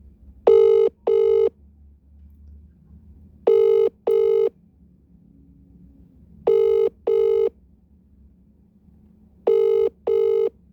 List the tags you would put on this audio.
Sound effects > Electronic / Design
telephone
cellphone
phone
mobile
ringtone

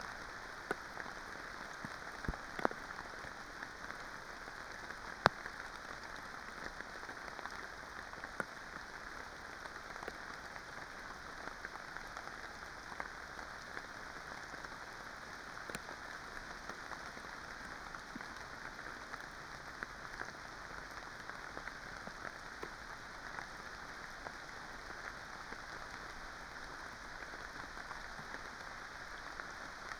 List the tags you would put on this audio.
Soundscapes > Nature
alice-holt-forest
artistic-intervention
data-to-sound
Dendrophone
field-recording
modified-soundscape
natural-soundscape
nature
phenological-recording
raspberry-pi
sound-installation
soundscape
weather-data

